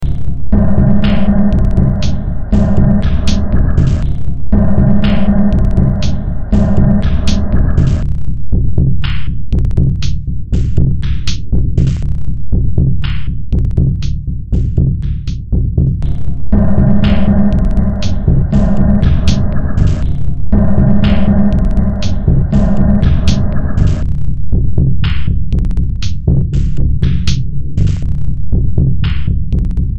Music > Multiple instruments
Demo Track #3267 (Industraumatic)
Underground, Soundtrack, Noise, Games, Ambient, Cyberpunk, Sci-fi, Horror, Industrial